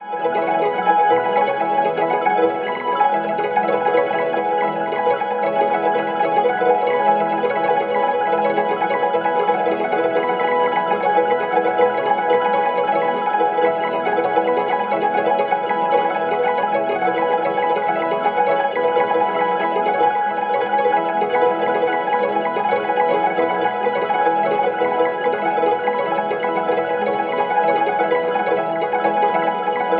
Soundscapes > Synthetic / Artificial
Botanica-Granular Ambient 6
Botanica, Beautiful, Ambient, Atomosphere, Botanical